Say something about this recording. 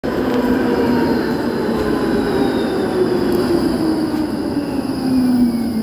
Sound effects > Vehicles
A tram is passing by and slowing down speed. Recorded in Tampere with a Samsung phone.